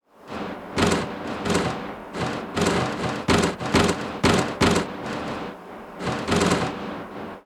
Sound effects > Other mechanisms, engines, machines
Tough Enigne1
Hi! That's not recorded sound :) I synth it with phasephant! Used a sound from Phaseplant Factory: MetalCaseShut1. I put it into Granular, and used distortion make it louder! Enjoy your sound designing day!